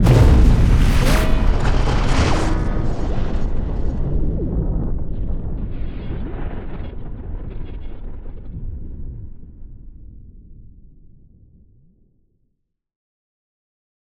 Sound effects > Other
All samples used in the production of this sound effect are field recordings that I recorded myself. I mixed the field samples with samples designed in the ASM Hydrasynth Deluxe synthesizer. Field recording equipment: Tascam Portacapture x8 and microphone: RØDE NTG5. Samples of various kick types recorded by me and samples from the ASM Hydrasynth Deluxe were layered in Native Instruments Kontakt 8, and then final audio processing was performed in REAPER DAW.